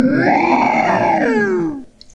Sound effects > Experimental
Creature Monster Alien Vocal FX (part 2)-031
Alien, bite, Creature, demon, devil, dripping, fx, gross, grotesque, growl, howl, Monster, mouth, otherworldly, Sfx, snarl, weird, zombie